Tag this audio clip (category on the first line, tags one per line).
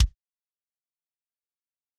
Instrument samples > Percussion
drumkit
sample
trigger
kick
kickdrum
drums